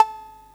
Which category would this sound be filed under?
Instrument samples > Synths / Electronic